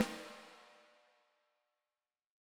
Music > Solo percussion
Snare Processed - Oneshot 3 - 14 by 6.5 inch Brass Ludwig
snare, rimshot, rimshots, snareroll, flam, realdrums, realdrum, percussion, brass, fx, snares, acoustic, hit, oneshot, rim, drum, kit, beat, reverb, sfx, drums, roll, snaredrum, ludwig, processed, hits, perc, crack, drumkit